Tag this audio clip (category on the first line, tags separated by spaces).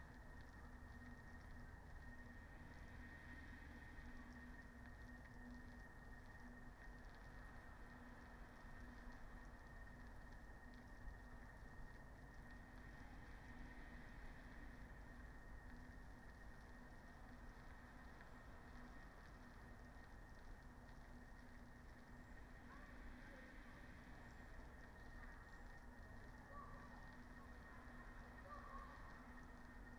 Soundscapes > Nature
raspberry-pi weather-data alice-holt-forest Dendrophone natural-soundscape modified-soundscape sound-installation artistic-intervention data-to-sound soundscape phenological-recording field-recording nature